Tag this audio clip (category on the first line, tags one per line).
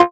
Instrument samples > Synths / Electronic
fm-synthesis
bass